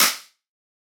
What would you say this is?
Instrument samples > Percussion
clap vocal
made with vital
clap, drum, edm